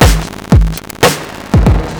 Music > Solo percussion
Industrial Estate 32
120bpm, Ableton, chaos, industrial, loops, soundtrack, techno